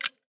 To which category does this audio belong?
Sound effects > Human sounds and actions